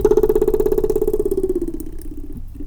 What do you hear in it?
Sound effects > Objects / House appliances
knife and metal beam vibrations clicks dings and sfx-100
Foley, SFX, Metal